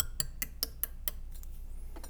Sound effects > Objects / House appliances
knife and metal beam vibrations clicks dings and sfx-121
Foley, Vibration, ting, ding, FX, Klang